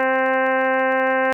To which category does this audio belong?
Instrument samples > Synths / Electronic